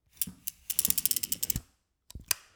Sound effects > Objects / House appliances
250726 - Vacuum cleaner - Philips PowerPro 7000 series - Telescopic broom retraction
7000, aspirateur, cleaner, FR-AV2, Hypercardioid, MKE-600, MKE600, Powerpro, Powerpro-7000-series, Sennheiser, Shotgun-mic, Shotgun-microphone, Single-mic-mono, Tascam, Vacum, vacuum, vacuum-cleaner